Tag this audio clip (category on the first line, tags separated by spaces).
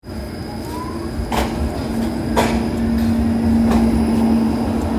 Sound effects > Vehicles
city field-recording Tampere traffic tram